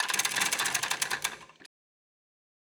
Sound effects > Other mechanisms, engines, machines
Pull Chain-01
mechanical, loadingdoor, machinery, gears, chain